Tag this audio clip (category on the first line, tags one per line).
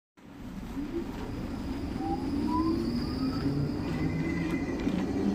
Soundscapes > Urban
tram
finland
hervanta